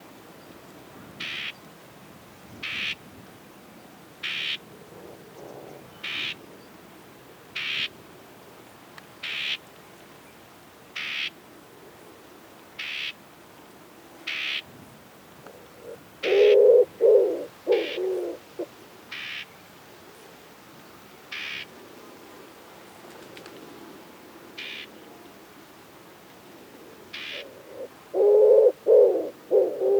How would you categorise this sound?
Soundscapes > Nature